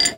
Sound effects > Objects / House appliances
FOODGware-Samsung Galaxy Smartphone, CU Thai Gourmet-Glass Mugs Toast, Muted Nicholas Judy TDC
Muted glass mugs toast. Recorded at Thai Gourmet Restaurant.
Phone-recording, foley, mug, muted, toast, glass